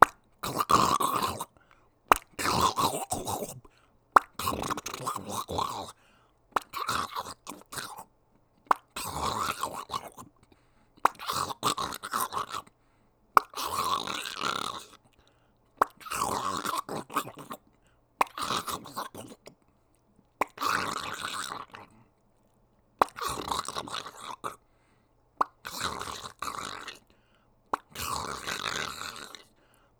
Sound effects > Human sounds and actions
TOONMisc-Blue Snowball Microphone, CU Pops, Munches, Comedic Nicholas Judy TDC
Pops and munches. Comedic effect.
Blue-brand, Blue-Snowball, cartoon, comedic, munch, pop